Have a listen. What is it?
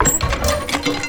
Sound effects > Other mechanisms, engines, machines
Handcar Pump 1 (In 1)
Handcar aka pump trolley, pump car, rail push trolley, push-trolley, jigger, Kalamazoo, velocipede, gandy dancer cart, platelayers' cart, draisine, or railbike sound effect, designed. First push sound of a total of 4. Can be used in sequence in1-out1-in2-out2 or in1/out1 can be randomly swapped with in2/out2.
animation, cart, crank, cranking, creak, creaking, draisine, gandy, hand, handcar, hand-crank, hard, heavy, iron, jigger, kalamazoo, mechanism, metal, pump, pumping, push, rail, railbike, sound-design, squeak, squeaking, tedious, trolley, vehicle, velocipede